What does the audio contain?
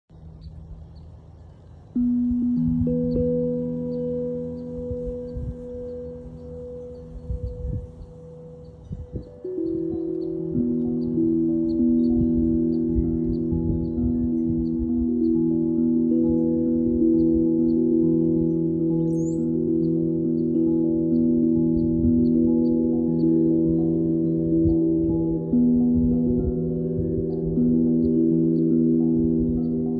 Music > Solo instrument
Last Week - Ambient Tongue Drum
Ambient tongue drum song.